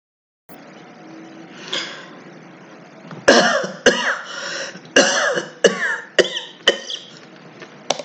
Speech > Other
The sound of a cough from a thirty-something Egyptian man.